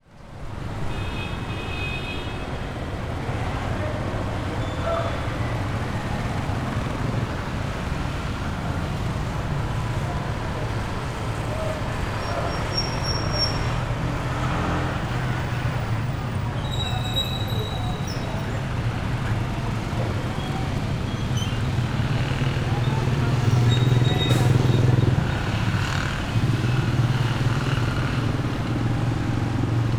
Soundscapes > Urban
Traffic in a street of Calapan city, Philippines. I made this recording near a downtown street of Calapan city (Oriental Mindoro, Philippines), standing just in front of Nuciti Mall, which shall open a few minutes later. One can hear quite heavy traffic with lots of vehicles (cars, motorcycles, some trucks and some jeepneys) passing by and onking at times, as well as the voices of some people in the street. Recorded in July 2025 with a Zoom H6essential (built-in XY microphones). Fade in/out applied in Audacity.